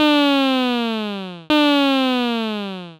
Sound effects > Electronic / Design
alarm i made in audacity